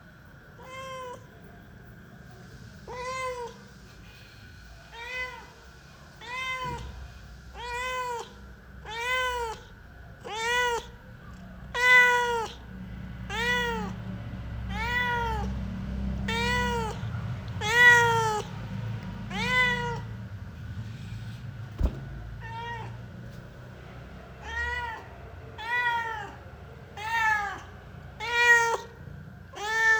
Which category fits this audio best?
Soundscapes > Nature